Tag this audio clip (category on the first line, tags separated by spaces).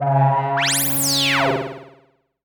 Sound effects > Experimental
analog sci-fi machine fx effect bass snythesizer dark electronic synth trippy vintage basses weird robotic electro sfx sample robot pad bassy alien sweep complex oneshot analogue retro scifi korg mechanical